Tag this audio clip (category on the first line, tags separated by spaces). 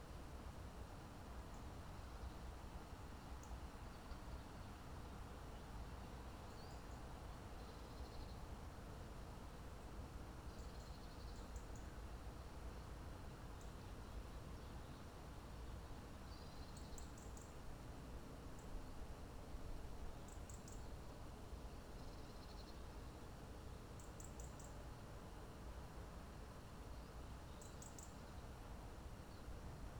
Soundscapes > Nature
alice-holt-forest natural-soundscape field-recording nature raspberry-pi soundscape phenological-recording meadow